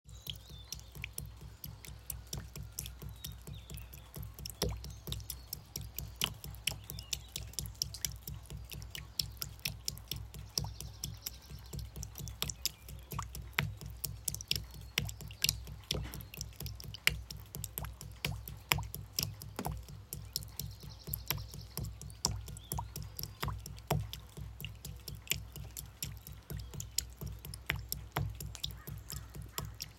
Soundscapes > Nature
Spring water drumming